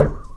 Sound effects > Other mechanisms, engines, machines
Handsaw Pitched Tone Twang Metal Foley 2
metal, smack, foley, plank, handsaw, twang, hit, household, twangy, vibration, percussion, tool, sfx, metallic, saw, shop, perc, fx, vibe